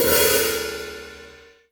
Music > Solo instrument
Vintage Custom 14 inch Hi Hat-015
Custom, Cymbals, Drum, Kit, Oneshot, Percussion